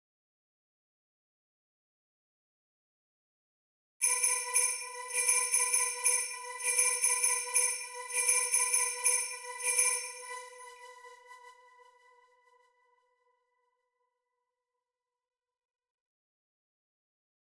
Music > Solo percussion
Cym-fill 23
solo, silence, percussion, b4, 535, sec